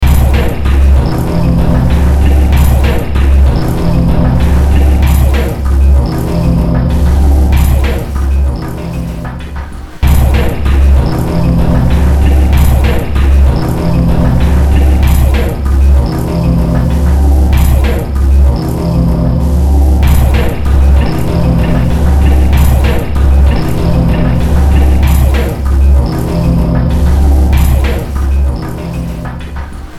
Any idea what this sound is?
Music > Multiple instruments
Short Track #3791 (Industraumatic)
Ambient, Cyberpunk, Horror, Sci-fi, Soundtrack, Underground